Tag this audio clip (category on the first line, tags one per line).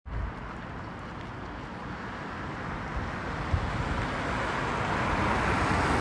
Sound effects > Vehicles
car
vehicle